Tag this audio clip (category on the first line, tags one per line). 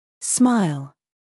Speech > Solo speech
english
pronunciation
voice
word